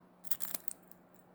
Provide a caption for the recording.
Sound effects > Objects / House appliances
sound of swing some small metal
swing, chain, keychain